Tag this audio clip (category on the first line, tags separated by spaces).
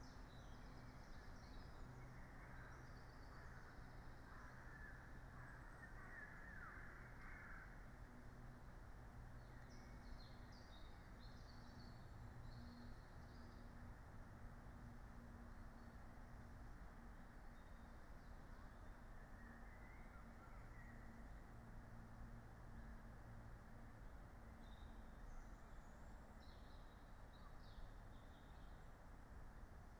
Soundscapes > Nature
raspberry-pi sound-installation data-to-sound phenological-recording natural-soundscape